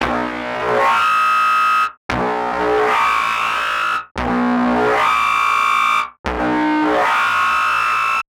Sound effects > Electronic / Design
MECH TRANSFER ONE
digital, effect, electric, electronic, future, fx, machine, mech, mechanic, mechanical, robot, sci-fi, sound-design, sounddesign, soundeffect, synth, synthesis, synthetic